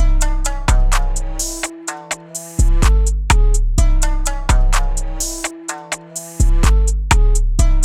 Music > Multiple instruments
Arabian Trap Beat Loop 125BPM
Loop made in FL11 with only basic samples.